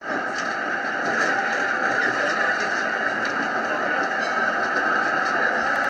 Sound effects > Vehicles
tram sounds emmanuel 15
23, line, tram